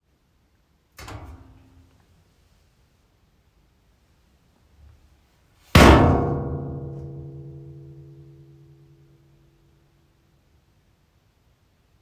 Sound effects > Objects / House appliances
Washer Door Open & Close
Washing machine/washer door opening mildly, then closing loudly.
appliance, laundry, machine, washer, washing